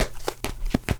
Sound effects > Human sounds and actions
Sewer Stitcher Fixer
fixed, Stitched, Fixing, thread, Sewing, needle, seamstress, seamster, Sewer, Sew, Stitcher, Fix, Quick, prick, textile, Stitch, puncture, handsewing, pop, item, Sewn, pin, cloth, game, Stitching, Fixer, hand-sewing